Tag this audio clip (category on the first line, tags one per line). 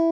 Instrument samples > String
tone
guitar
design
stratocaster
sound